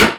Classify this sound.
Instrument samples > Percussion